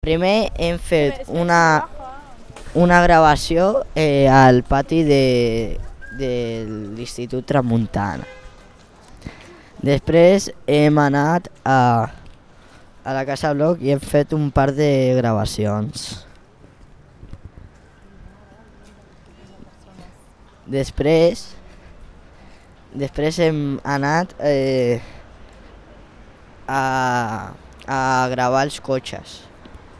Soundscapes > Urban
20251118 IETramuntana Retorn AndreuLucia
Urban Ambience Recording in collab IE Tramunta, Barcelona, Novembre 2025. Using a Zoom H-1 Recorder. In the context of "Iteneraris KM.0" Project.
Urban, SoundMap